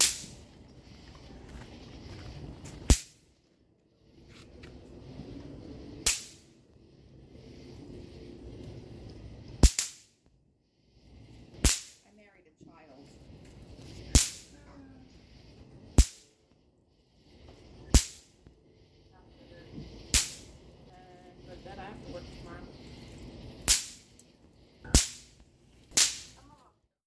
Sound effects > Natural elements and explosions
FRWKRec-Samsung Galaxy Smartphone, CU Snappers Snapping Nicholas Judy TDC
Snappers snapping. Distant cicadas.
snap, snapper, pop, Phone-recording, fireworks, cartoon